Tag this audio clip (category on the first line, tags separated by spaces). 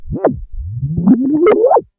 Sound effects > Electronic / Design
soundeffect sweep sci-fi glitch game-audio effect digital stutter modulation wobble sound-design electric electronic synth